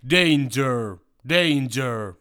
Speech > Solo speech
danger danger
danger, english, male, speech, voice, warning